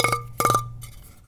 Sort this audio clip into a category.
Music > Solo instrument